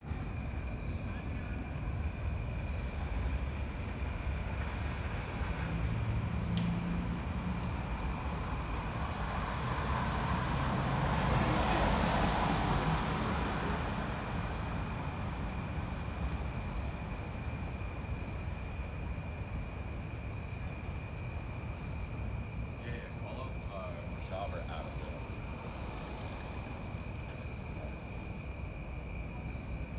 Soundscapes > Urban
crickets and cars
it's serene when it's not game day own sound recorded with iphone 16 pro voice memos app